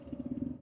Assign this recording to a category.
Sound effects > Animals